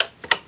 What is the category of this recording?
Sound effects > Objects / House appliances